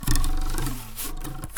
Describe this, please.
Sound effects > Other mechanisms, engines, machines
metal shop foley -128
bam, bang, boom, bop, crackle, foley, fx, knock, little, metal, oneshot, perc, percussion, pop, rustle, sfx, shop, sound, strike, thud, tink, tools, wood